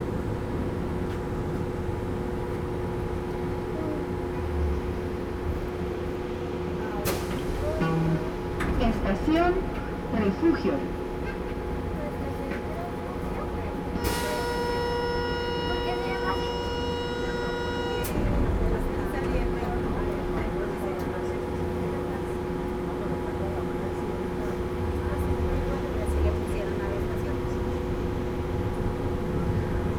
Soundscapes > Urban
Estaciones Refugio y Juarez
Public address system announcing arrival to Refugio and Juárez train stations in Guadalajara, México. This is a long one, as it includes the trip between the two stations and also the ambience sounds of going out to the street.